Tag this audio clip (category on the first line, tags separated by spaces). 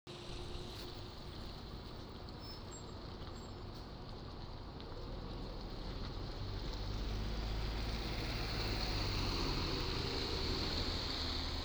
Sound effects > Vehicles
bus transportation vehicle